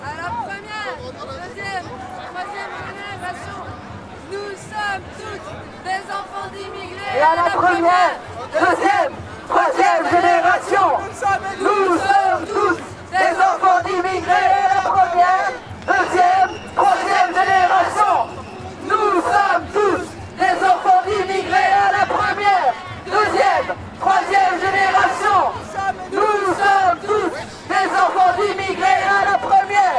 Soundscapes > Urban
Manifestation in Brussels / Nous sommes toustes des enfants d'immigre.e.s
13 th of February 2025, Brussels : national manifestation / demonstration for public services and purchasing power 13 février 2025, Bruxelles : manifestation / grève nationale pour les services publics et le pouvoir d'achat Recorded with Microphone = Sanken CMS-50 (MS) decoded in STEREO Recorder = Sound Devices MixPre 3 I REF = 25_02_13_13_18
antifascist, brussels, demonstration, general, manifestation, streets, strike, voices